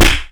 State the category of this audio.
Sound effects > Human sounds and actions